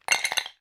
Sound effects > Other
spell ice a
14 - Weak Ice Spells Foleyed with a H6 Zoom Recorder, edited in ProTools
ice, spell, weak